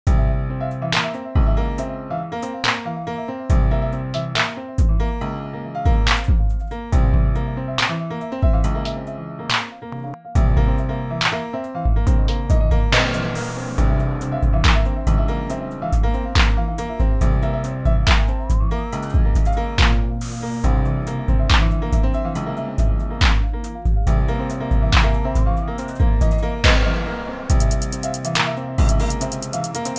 Music > Multiple instruments
Piano stucc w Bangin Beat fer da Clerb 140 bpm
a weird lil stuccato piano loop with a bangin beat i made in fl studio
piano, improvised, triphop, drumloop, beat, hop, hip, key, groovy, percs, loop, percussion, quantized, staccato, funky, percussion-loop, perc-loop, keys, dance, hiphop, per, drum, drums, weird, drumbeat, percloop, loopable